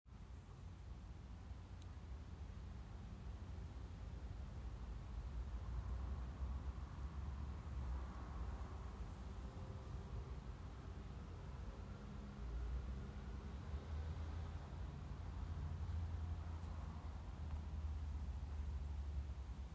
Soundscapes > Indoors
Inside apartment building
In this recording, i'm located inside a living room. It is so quiet you could hear a pin drop. You are still able to pick up the sounds of cars driving by because I'm sitting next to the balcony.